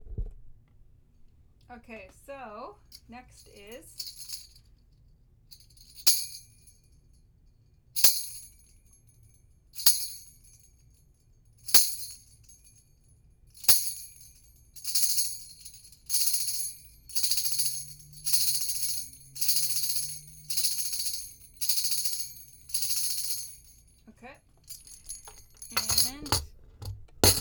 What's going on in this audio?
Instrument samples > Other
this is a tambourine i recorded